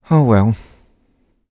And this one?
Speech > Solo speech
Sadness - Oh well
dialogue, Human, Male, Man, Mid-20s, NPC, oneshot, Sadness, singletake, Single-take, talk, Tascam, U67, Video-game, voice, words